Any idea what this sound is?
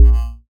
Instrument samples > Synths / Electronic
BUZZBASS 2 Bb
additive-synthesis, bass, fm-synthesis